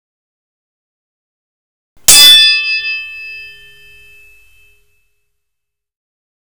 Sound effects > Objects / House appliances
Tanjiro or HF inspired silverware sword hit w ringout V2 01202026
anime, attack, battle, blade, clang, combat, demonslayer, ding, duel, epee, fantasy, fate, fight, fighting, hit, impact, karate, knight, kung-fu, martialarts, medieval, melee, metal, ring, ringout, sword, swords, ting, war, weapon